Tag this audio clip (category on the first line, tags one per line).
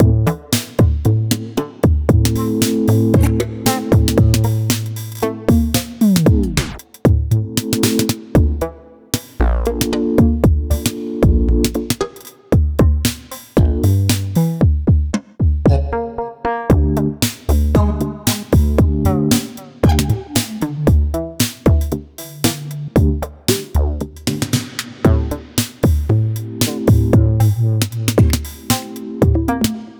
Music > Solo percussion
beat
glitch-hop
minimal
nice